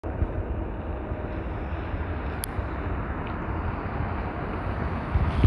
Soundscapes > Urban
A bus passing the recorder in a roundabout. The sound of the bus engine and tires can be heard with some distortion at the middle of the recording. Recorded on a Samsung Galaxy A54 5G. The recording was made during a windy and rainy afternoon in Tampere.
bus, engine, passing